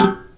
Sound effects > Other mechanisms, engines, machines
Handsaw Oneshot Metal Foley 15
hit, vibe, household, sfx, fx, vibration, shop, foley, metal, handsaw, smack, twang, plank, twangy, tool, metallic, saw, percussion